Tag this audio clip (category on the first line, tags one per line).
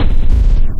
Instrument samples > Synths / Electronic
bass
bassdrop
clear
drops
lfo
low
lowend
stabs
sub
subbass
subs
subwoofer
synth
synthbass
wavetable
wobble